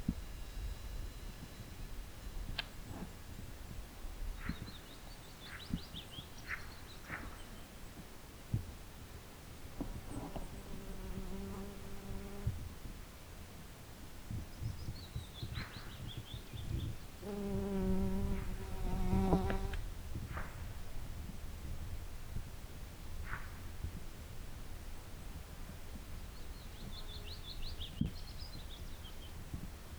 Soundscapes > Nature
nature in Norway
bee, fly, norway